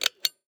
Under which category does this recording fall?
Sound effects > Human sounds and actions